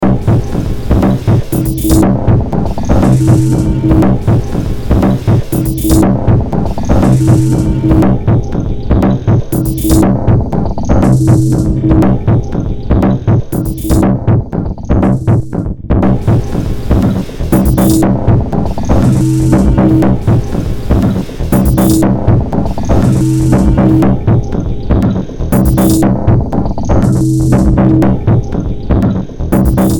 Music > Multiple instruments
Demo Track #3991 (Industraumatic)
Ambient, Cyberpunk, Games, Horror, Industrial, Noise, Sci-fi, Soundtrack, Underground